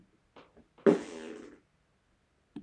Sound effects > Human sounds and actions
Fart. (MacBookAirM1 microphone in Reaper’s DAW)
mad, funny, crazy, farty